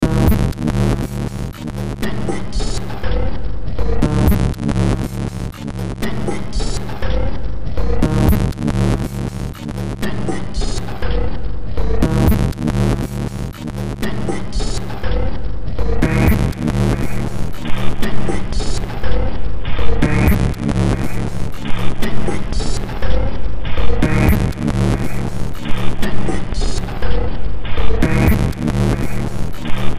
Music > Multiple instruments
Demo Track #3066 (Industraumatic)
Sci-fi, Industrial, Soundtrack, Cyberpunk, Horror, Noise, Games, Ambient, Underground